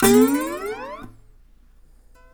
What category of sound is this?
Music > Solo instrument